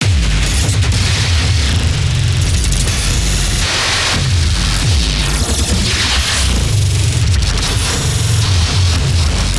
Music > Multiple instruments
hitchhog glitch 200bpm
200bpm, drums